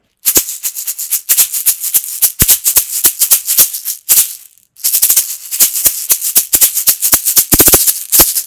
Instrument samples > Percussion
Cuba Maracas 2 (MacBookAirM1 microphone in Reaper’s DAW)
maracas, percussion, sample